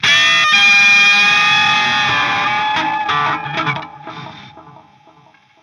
Instrument samples > String
"High-gain electric guitar lead tone from Amplitube 5, featuring a British Lead S100 (Marshall JCM800-style) amp. Includes noise gate, overdrive, modulation, delay, and reverb for sustained, aggressive sound. Perfect for heavy rock and metal solos. Clean output from Amplitube."